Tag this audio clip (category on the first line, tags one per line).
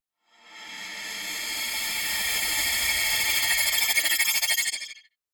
Sound effects > Electronic / Design
tremelo
reverse
high-pitched
shimmering
crystal
magic